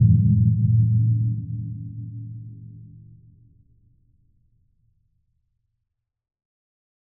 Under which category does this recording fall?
Sound effects > Electronic / Design